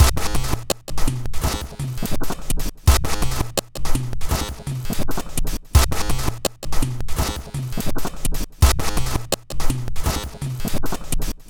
Instrument samples > Percussion
This 167bpm Drum Loop is good for composing Industrial/Electronic/Ambient songs or using as soundtrack to a sci-fi/suspense/horror indie game or short film.
Drum, Underground, Ambient, Dark, Samples, Loop, Soundtrack, Weird, Alien, Industrial, Loopable, Packs